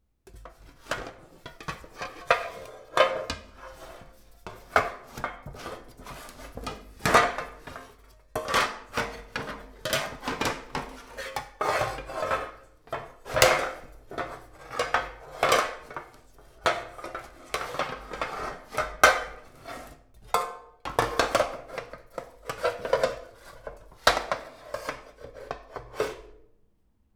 Sound effects > Objects / House appliances
metal pans handling and tweaking
Tweaking a pile of 3 metal pans (1 pizza pan and 2 cake pans). Recorded with Zoom H2.